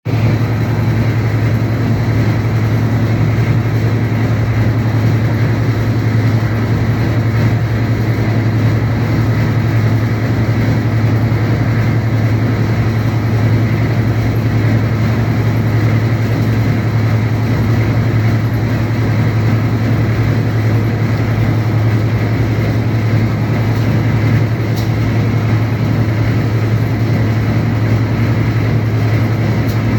Sound effects > Objects / House appliances
My washer and dryer running at the same time and creating a composite rhythm. Recorded on my iPhone 15 Pro and edited in Logic Pro X.
electric, household